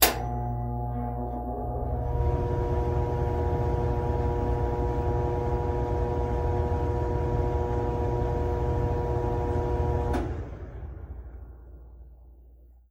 Sound effects > Objects / House appliances
HVAC Blower Fan
The blower from a 1980's York forced-air furnace starting up.
blower, ventilation, fan, air, hvac, furnace, ac